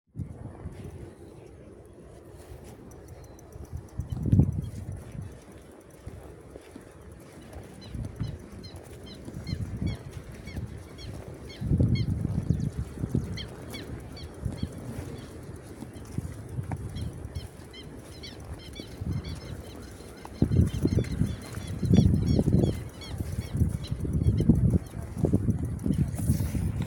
Soundscapes > Nature
Birds from wetland in Bogotá
Sounds from the bird rail on a wetland from Bogotá, Colombia.
birdsounds, noisywind, wildlife